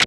Sound effects > Electronic / Design
I used 20 OTT and some waveshaper Fruity fast dist, Ohmicide and Khs phase distortion to make a patcher strain. The I used multiple Fracture to Glitch it, and put Vocodex randomly. Finaly I used Khs phase distortion to make some of its freq band distorted so that it can sound like ture gear. Sample used from: TOUCH-LOOPS-VINTAGE-DRUM-KIT-BANDLAB